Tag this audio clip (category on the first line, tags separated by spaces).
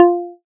Instrument samples > Synths / Electronic
additive-synthesis fm-synthesis pluck